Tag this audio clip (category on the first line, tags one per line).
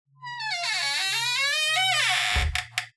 Sound effects > Other mechanisms, engines, machines
creaks
hinge
squeaky